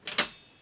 Sound effects > Objects / House appliances
Sauna door opening. Recorded with my phone.
door, open, sauna, wooden, opening